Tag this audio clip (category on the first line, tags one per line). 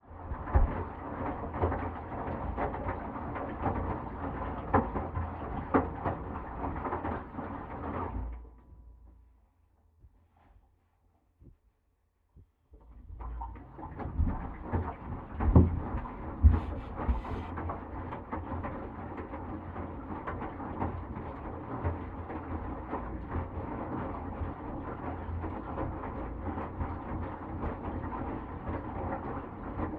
Sound effects > Objects / House appliances
appliances
deep
home
household